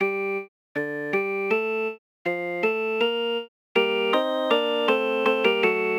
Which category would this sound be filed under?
Music > Solo instrument